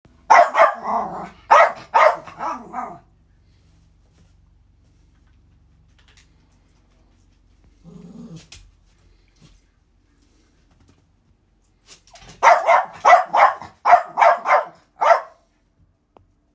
Sound effects > Animals
elvis barking
Elvis is a little terrier that barks a few times, walks across a cement floor, growls and barks again in this clip. Recorded live on an iPhone in a living room indoors. Recorded by me.
bark, dog, Terrier, Yorkie